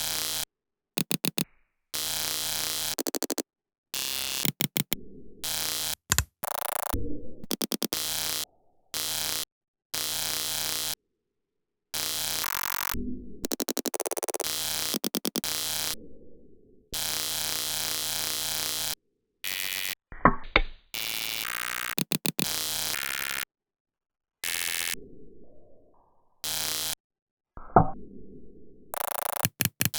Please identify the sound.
Instrument samples > Synths / Electronic
Organic Electronic Crickets Concert
Electronic crickets concert made out of some electronic noise
chirp, detuning, insect, texture